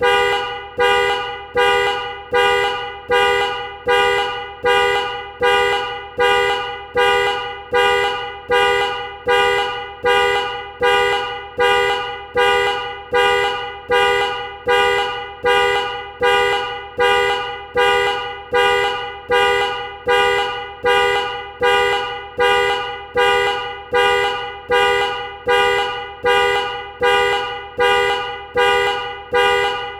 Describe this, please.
Sound effects > Vehicles
VEHAlrm-CU Car Alarm, Horn Honks, Looped Nicholas Judy TDC
A car alarm horn honking. Looped.